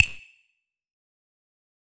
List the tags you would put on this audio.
Sound effects > Electronic / Design
Button
FLStudio

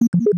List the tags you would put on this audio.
Sound effects > Electronic / Design
alert
interface
confirmation
selection
digital
message

